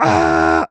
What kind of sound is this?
Speech > Solo speech
Frustrated Ahhh 1
Subject : Mid 20s male. When frustrated I let out a cheesy "AHHH" like a sigh. Date YMD : 2025 June 14 Location : Albi 81000 Tarn Occitanie France. Hardware : Tascam FR-AV2, Shure SM57 with A2WS windcover Weather : Processing : Trimmed in Audacity.
vocal, frustrated, angry, one-shot, Sm57, Tascam, FRAV2, mid-20s, FR-AV2, male, ahh, Ahhh, 20s, A2WS, ah, human